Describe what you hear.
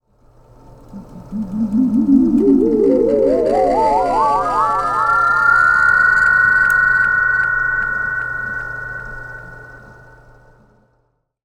Sound effects > Electronic / Design
A 1950's synthesized spacey slide up. Created using Femur Design's Theremin app.